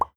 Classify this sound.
Sound effects > Human sounds and actions